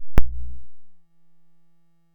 Sound effects > Electronic / Design
Optical Theremin 6 Osc dry-041
Dub, Infiltrator, Theremins, DIY, Alien, Synth, Electronic, Bass, Sci-fi, Handmadeelectronic, Analog, noisey, Trippy, Noise, Electro, Robot, SFX, Glitch, Digital, Instrument, FX, Sweep, Spacey, Scifi, Theremin, Optical, Otherworldly, Experimental, Robotic, Glitchy